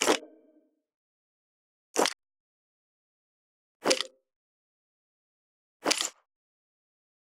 Sound effects > Electronic / Design
4 variations of a generic game pick up created with fabric, plastic and small metal elements, plus a simple synth note in some of them. Gear: Focusrite 2i2 3rd Gen Sennheiser 835